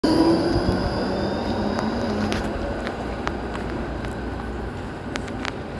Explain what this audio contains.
Sound effects > Vehicles
07tram leavinghervanta
A tram is speeding up. In the audio there is also footsteps and a bit of rattling. Recorded in Tampere with a samsung phone.